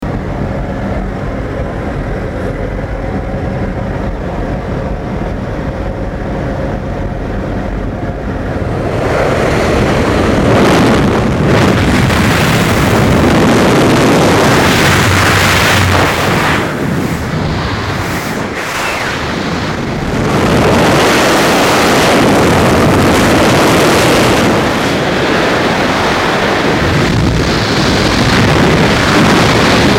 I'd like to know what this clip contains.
Sound effects > Other
Solo Skydive Interior plane freefall, descent under canopy and landing Recorded on SONY DCR-PC110 Video Camera